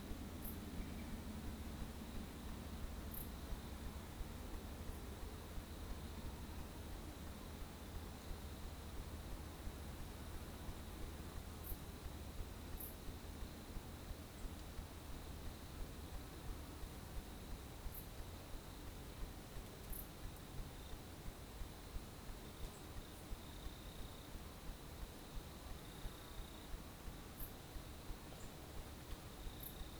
Soundscapes > Nature
2025 09 09 14h50 Combe de poisot H2n Surround mode Q9
Subject : Recording the Combe De Poisot between Gergueil and Poisot. Facing south. Mic a little to the north from the road at the very bottom of the comb. Date YMD : 2025 September 09 14h50 Location : Gergueil 21410 Bourgogne-Franche-Comté Côte-d'Or France. GPS = 47.24703555810057, 4.828994422113224 Hardware : Zoom H2n set in 2 channel surround mode. Held up in a tree using a Smallrig magic arm. Weather : 80% cloudy. 18°c day. A bit of wind. Processing : Trimmed and normalised in Audacity. Removed some wind via a HPF at wind moments.